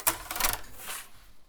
Sound effects > Other mechanisms, engines, machines
metal shop foley -121
bop, pop, shop, rustle, sfx, wood, sound, percussion, metal, tools, oneshot, knock, little, perc, bang, thud, tink, bam, foley, strike, fx, crackle, boom